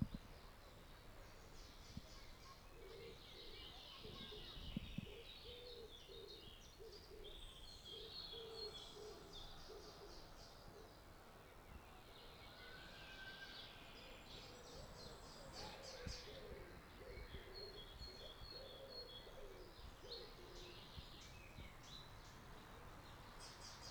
Soundscapes > Nature
birds chirping in a park
A nice bird chipring in a park
field-recording, nature, birdsong, spring, birds, chipring, forest